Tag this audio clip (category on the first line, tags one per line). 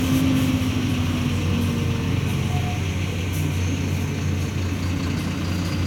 Sound effects > Vehicles
motor
vehicle
bus
drive
engine
driving